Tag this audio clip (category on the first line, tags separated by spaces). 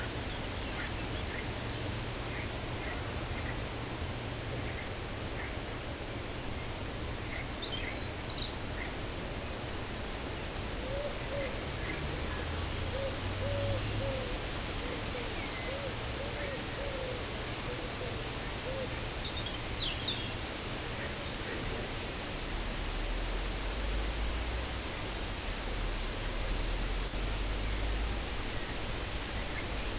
Soundscapes > Nature
Graussals 81000 Albi Park May FR-AV2 Rode ORTF thursday Prat-Graussals birds NT5 Orat Tascam Field-Recording 2025